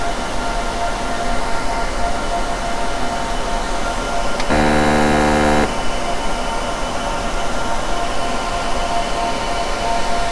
Sound effects > Electronic / Design
Server Room with Buzzer
Title sums it up. Could sound like the control room of a UFO or ?